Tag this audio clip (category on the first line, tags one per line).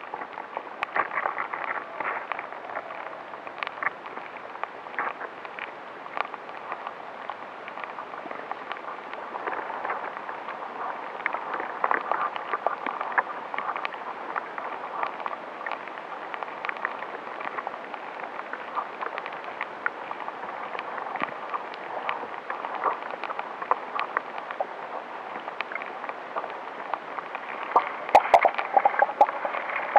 Soundscapes > Nature
hydrophone
submerged
underwater
bubbles
moss
bubbling
bog